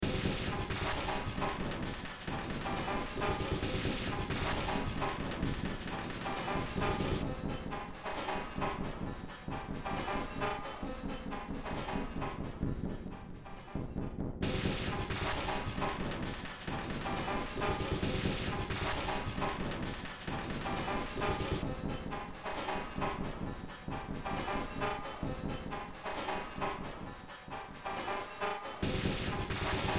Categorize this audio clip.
Music > Multiple instruments